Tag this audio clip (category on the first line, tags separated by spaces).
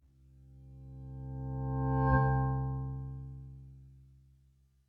Sound effects > Electronic / Design
company effect gaussian sound